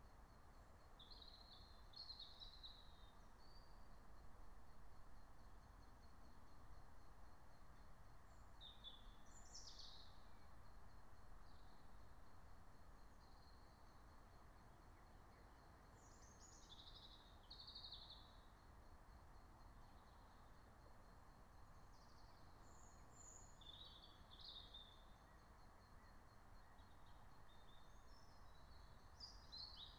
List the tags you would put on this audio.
Soundscapes > Nature
field-recording
natural-soundscape
raspberry-pi
alice-holt-forest
meadow
soundscape
nature
phenological-recording

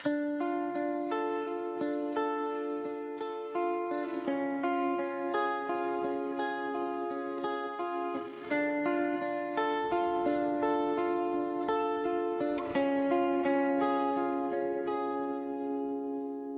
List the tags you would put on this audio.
Music > Other
BM
depressive
electric
guitar
sample

